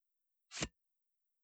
Sound effects > Objects / House appliances
Drawing Dagger
A dagger being drawn. Though crediting is appreciated.